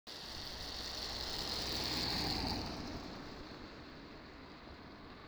Sound effects > Vehicles
tampere car5
automobile, vehicle, car